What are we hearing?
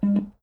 Sound effects > Electronic / Design
A low-pitched beep.